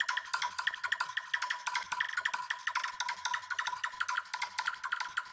Music > Solo percussion
Distorted Clicks 180bpm #1
an abstract sample i made and put it through vocodex and gross beat
abstract, percussion, SciFi